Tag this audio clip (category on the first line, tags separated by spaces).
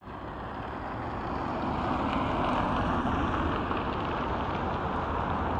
Soundscapes > Urban
car traffic vehicle